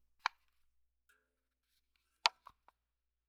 Sound effects > Objects / House appliances
alumminum can foley metal tap scrape water sfx fx household